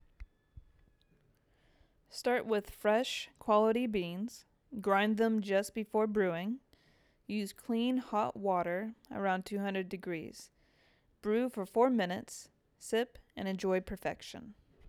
Speech > Solo speech
How to Make the Perfect Cup of Coffee
A quick and easy voiceover guide for brewing the perfect cup of coffee. Perfect for lifestyle content, tutorials, or casual videos. Script: "Start with fresh, quality beans. Grind them just before brewing. Use clean, hot water — around 200 degrees. Brew for 4 minutes. Sip and enjoy perfection."
BrewTips, CoffeeLovers, CoffeeTime, HowTo, LifeSkills, PublicGuide, VoiceOver